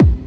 Instrument samples > Synths / Electronic
606ModBD OneShot 04
606, Analog, Bass, BassDrum, Drum, DrumMachine, Electronic, Kit, Mod, Modified, music, Synth, Vintage